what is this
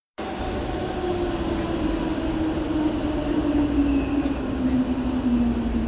Sound effects > Vehicles
A tram slowing by in Tampere, Finland. Recorded with OnePlus Nord 4.
tram, transportation, vehicle